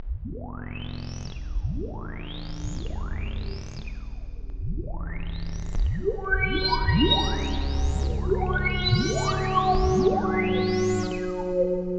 Instrument samples > Synths / Electronic
PPG Wave 2.2 Boiling and Whistling Sci-Fi Pads 10
cinematic, content-creator, dark-design, dark-soundscapes, dark-techno, drowning, horror, mystery, noise, noise-ambient, PPG-Wave, science-fiction, sci-fi, scifi, sound-design, vst